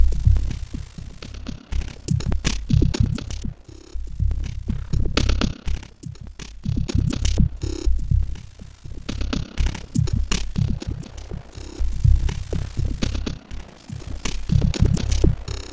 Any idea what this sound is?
Instrument samples > Percussion
This 122bpm Drum Loop is good for composing Industrial/Electronic/Ambient songs or using as soundtrack to a sci-fi/suspense/horror indie game or short film.
Dark, Packs, Weird, Loop, Loopable, Industrial, Alien, Samples